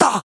Speech > Solo speech
Random Brazil Funk Volcal Oneshot 4
Recorded with my Headphone's Microphone, I was speaking randomly, and tightened my throat. I even don't know that what did I say，and I just did some pitching and slicing works with my voice. Processed with ZL EQ, ERA 6 De-Esser Pro, Waveshaper, Fruity Limiter.
Acapella, BrazilFunk, EDM, Oneshot, Volcal